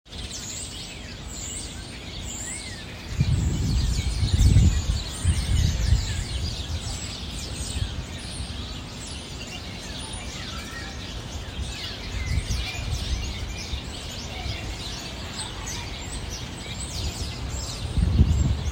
Soundscapes > Nature
While going home from class, I found myself walking under two trees filled to the brim with small birds. Their singing was extremely loud and beautiful, so I decided to record!